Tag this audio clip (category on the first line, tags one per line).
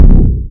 Instrument samples > Percussion
electrical
electrobuzz
electrohum
electronic
electrons
electrowhoosh
emission
plasma
robot
soundesign
space
UFO
whoosh